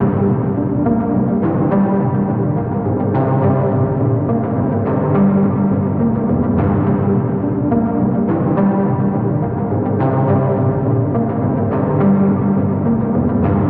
Music > Solo instrument
Ambient groove loop 280bpm
Made in FL studio with Flex and effects such as Valhalla supermassive. Use for anything :)
280bpm, ambient, atmosphere, drone, groove, music, pad